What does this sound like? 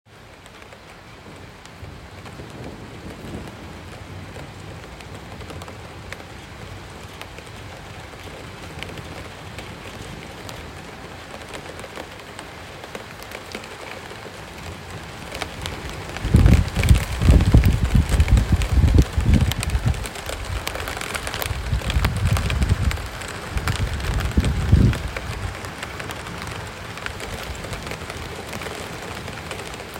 Soundscapes > Nature
Light Rain turning into Rainstorm
It startet to rain and i began to record it from my balcony. The rain turned into a full Rainstorm with hail and thunder
weather, thunder, storm, wind, nature, field-recording, lightning, hail, thunderstorm, rain